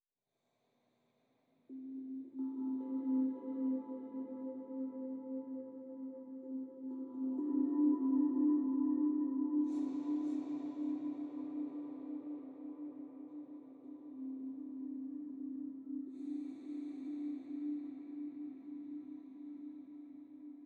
Music > Solo percussion
In The Fall
Abstract, Calm, Chill, Handpan, Outdoors, Short, Snippet, Song